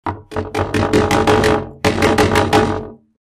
Sound effects > Human sounds and actions
Golpes insistentes a una puerta. Insistent knocking on a door.